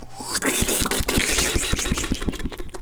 Sound effects > Experimental
Creature Monster Alien Vocal FX (part 2)-026
Creature, grotesque, otherworldly